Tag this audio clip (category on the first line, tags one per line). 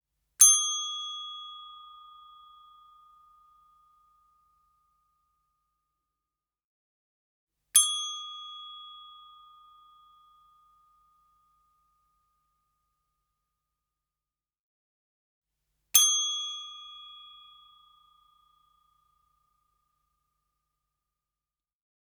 Sound effects > Objects / House appliances
chime
Bell
office
motel
counter
hotel
meeting
call
hall
service
reception
tourism